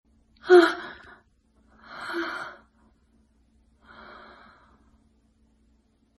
Sound effects > Human sounds and actions
A recording from an actress friend for a video game I was working on that never got finished. In this track, the main playable character takes a fatal wound and dies. With the heroine dead, the game is over. I'd love to see it. Enjoy.